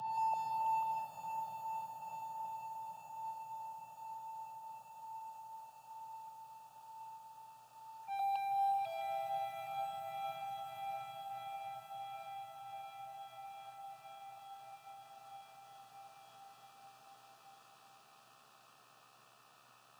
Instrument samples > Synths / Electronic
high casio pt-31 sound #2
high echoed ambient sound from Casio pt-31 effected with zoom 9030
ambiance, ambient, atmosphere, casio, digital, synth